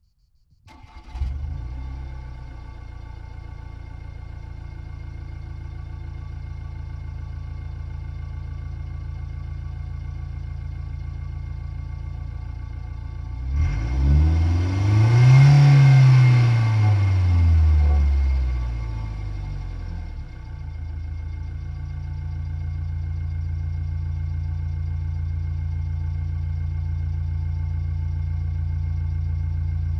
Sound effects > Vehicles
Ford 115 T350 - Engine under exhaust
Subject : Date YMD : 2025 August Location : Albi 81000 Tarn Occitanie France. Sennheiser MKE600 with stock windcover P48, no filter. Weather : Processing : Trimmed and normalised in Audacity. Date YMD : 2025 August 08 Around 19h30 Location : Albi 81000 Tarn Occitanie France. Weather : Sunny, hot and a bit windy Processing : Trimmed and normalised in Audacity. Notes : Thanks to OMAT for helping me to record and their time.
115, FR-AV2, A2WS, France, Mono, August, Tascam, Van, Ford, Vehicle, Ford-Transit, 2003-model, T350, Old, 2003, SM57, Single-mic-mono, 2025